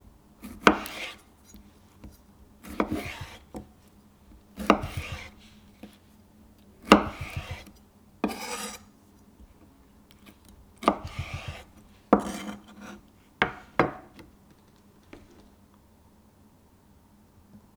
Sound effects > Human sounds and actions
cutting tomato
Slicing tomato in a kitchen
knife, tomato, slicing, cook, vegetables, cooking, cutting, kitchen, food, board, slice, vegetable